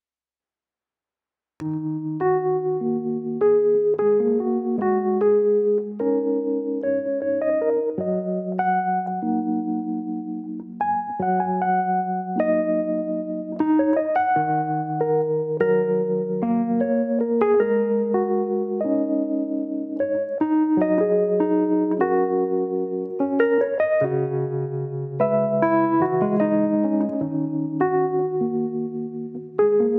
Music > Solo instrument
alike rhodes keys 75bpm 1lovewav
1lovewav, 75bpm, analog, instrument, jazz, rhodes, single-instrument